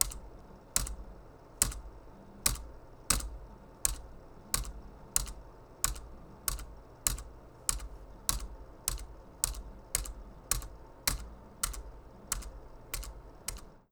Sound effects > Objects / House appliances
Pressing a backspace button on a keyboard.